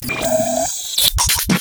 Sound effects > Experimental
Gritch Glitch snippets FX PERKZ-014
fx, snap, alien, perc, sfx, lazer, impact, glitch, clap, experimental, laser, impacts, zap, idm, abstract, otherworldy, pop, percussion, crack, hiphop, edm, whizz, glitchy